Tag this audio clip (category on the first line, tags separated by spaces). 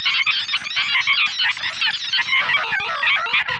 Sound effects > Electronic / Design
fantasy enemy sounddesign sfx videogame evil sci-fi robot